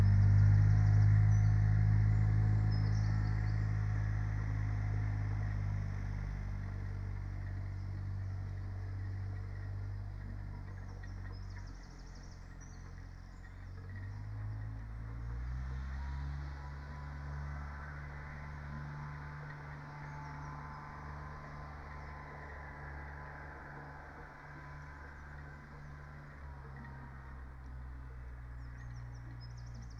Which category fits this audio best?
Soundscapes > Nature